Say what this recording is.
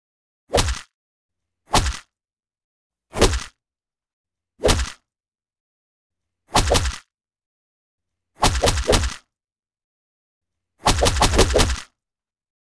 Sound effects > Human sounds and actions
kick w bone crunch inspired by tmnt 2012 w whoosh
sounds of punches inspired by tmnt 2012 w combo punches.
swoosh, martial-arts, crunch, fighting, kill, combat, brawl, break, whip, air, TMNT, karate, punch, hit, jeffshiffman, kung-fu, fight, battle, melee, hand-to-hand, combo, martialarts, bone, fist, kick, hand, fighter, ninjutsu, punching, attack